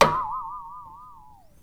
Sound effects > Other mechanisms, engines, machines
Handsaw Pitched Tone Twang Metal Foley 16
fx, hit, vibe, shop, twangy, smack, metallic, percussion, household, metal, sfx, vibration, twang, perc, handsaw, saw, plank, foley, tool